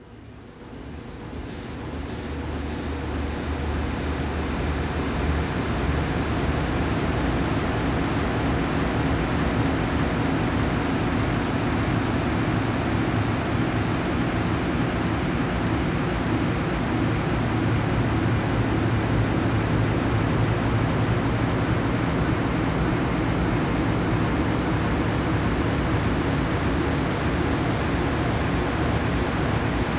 Sound effects > Other
End-of-the-world environment (Ambiente do fim do mundo) With long tail
This is an effect to use for: anguish, evil, bad things, shortness of breath, madness, irritation, dread, terror, cemetery, bleeding, and even the end of times.
pain, Tensao, subterrneo, dor, anguish, terror, angustia, espaco, ambiente, tension, underground, environment, space